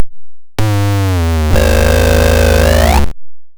Sound effects > Electronic / Design
Optical Theremin 6 Osc dry-086
Infiltrator, Theremin, Trippy, Sweep, Handmadeelectronic, Robot, Glitch, Instrument, Optical, Electronic, Glitchy, Synth, Noise, Sci-fi, SFX, Alien, Analog, Dub, Digital, Scifi, Bass, Electro, Experimental, FX, Otherworldly, noisey, Theremins, Robotic, DIY, Spacey